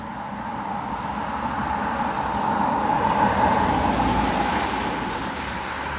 Soundscapes > Urban
A car driving by in Hervanta, Tampere. Some wind may be heard in the background. The sound was recorded using a Samsung Galaxy A25 phone